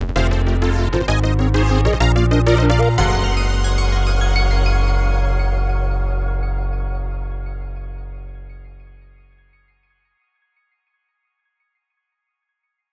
Music > Multiple instruments
Victory Fanfare (8-Bit Thunder) 3
This mix has no 8-bit distortion and a long, slowly fading tail.
cinematic-hit, discover-location, discovery, dylan-kelk, fanfare, find-item, find-key, get-item, level-up, mission-complete, quest-complete, rpg, rpg-video-game, triumph, triumphant, victory, video-game, video-game-level-up, video-game-mission-complete